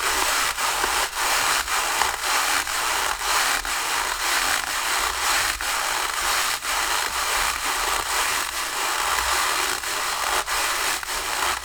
Sound effects > Objects / House appliances
PLASFric-Blue Snowball Microphone, CU Styrofoam Being Sawed Nicholas Judy TDC
Styrofoam being sawed.
Blue-brand, Blue-Snowball, foley, saw, styrofoam